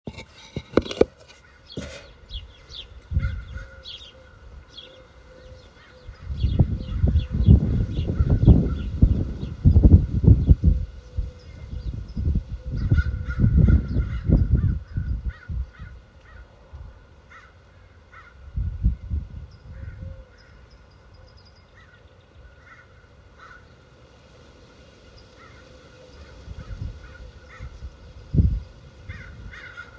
Soundscapes > Nature
Ambience inside the abalone shell 06/28/2023
Recording sound at the piano outdoors inside the abalone shell